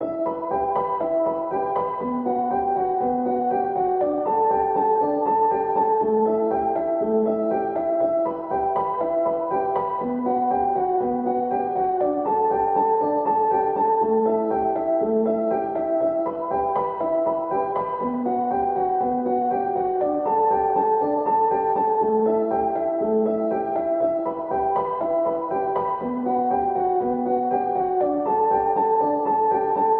Music > Solo instrument
Piano loops 150 efect 4 octave long loop 120 bpm
reverb, free, loop, 120bpm, 120, piano, music, pianomusic, samples, simple, simplesamples